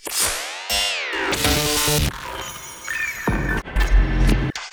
Sound effects > Experimental
Glitch Percs 1 scissor jagger
impact, sfx, impacts, alien, hiphop, lazer